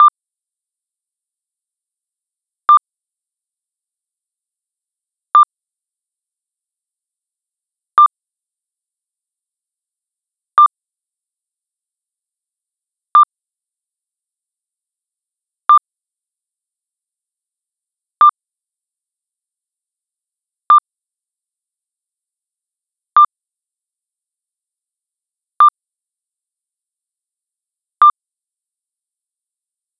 Sound effects > Electronic / Design
Patient monitor blips 1192hz - 20bpm to constant
Trim the tempo you like for your project. Add reverb, it will help alot! It can probably be used as a car reverse sensor. This was made by importing the individual blips into ableton live, pitch shifting them 7semitones up. And automating the tempo up.
medical-instrument, heart-monitor, blip, car-reverse, one-shot, beep, radar, patient-monitor, 1192hz, heart, sinewave, indicator, tone, oneshot